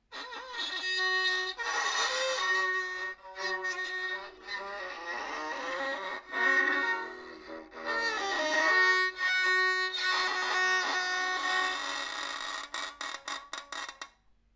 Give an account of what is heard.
Instrument samples > String
Bowing broken violin string 19
bow, broken, horror, strings